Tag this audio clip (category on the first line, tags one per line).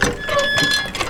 Sound effects > Other mechanisms, engines, machines
pump
tedious
creaking
kalamazoo
heavy
mechanism
sound-design
pumping
cranking
cart
iron
handcar
hand
crank
jigger
trolley
gandy
squeak
squeaking
hand-crank
push
metal
animation
creak
draisine
rail
railbike
velocipede
vehicle
hard